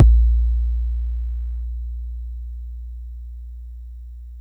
Instrument samples > Synths / Electronic
606ModBD OneShot 03
BassDrum, DrumMachine, Electronic, Vintage, Modified, music, Kit, Mod, Drum, 606, Bass, Analog, Synth